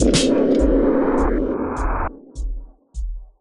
Sound effects > Electronic / Design
Impact Percs with Bass and fx-017
bash, bass, brooding, cinamatic, combination, crunch, deep, explode, explosion, foreboding, fx, hit, impact, looming, low, mulit, ominous, oneshot, perc, percussion, sfx, smash, theatrical